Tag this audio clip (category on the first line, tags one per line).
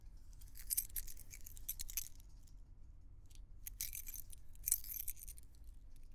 Sound effects > Objects / House appliances
jingle
keychain
keys